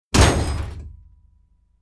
Sound effects > Other mechanisms, engines, machines
custom transformers scifi designed mechanical metal impact sound
fx, slam, mech, designed, impact, sci-fi, robotic, mechanism, machine, robot, industrial, mechanical, metal, machinery, hit, factory, metallic, droid, automaton, design, scifi, michaelbay, bionic, android, impactful